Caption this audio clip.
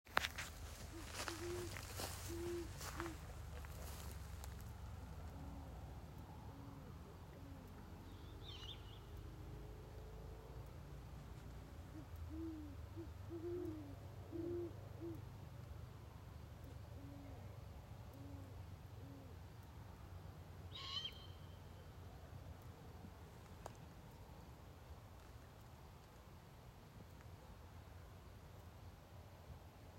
Soundscapes > Nature
Great horn owls and barn owl 08/15/2022
Great horn owls and barn owl
field-recordings, nature